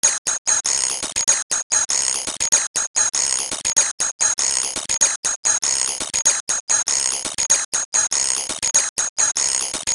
Sound effects > Electronic / Design
distorted 8bit soundloop 01
anyways its a cool drum 8bit other sound for music.
insane, heavilyprocessedukelele, loop, drumlikesound, 8bit